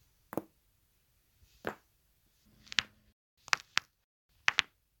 Sound effects > Human sounds and actions
Joint pops multiple 01
back, back-cracking, crack, cracking, finger, joint, joint-pop, joint-popping, joints, knuckles, pop, popping